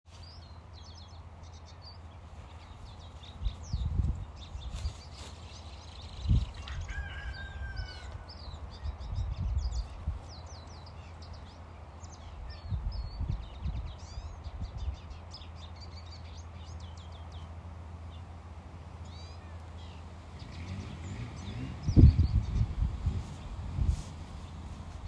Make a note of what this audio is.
Soundscapes > Nature
Farm ambience 04/06/2024
ambiance, birds, rural, field-recording, farm, countryside, nature, rooster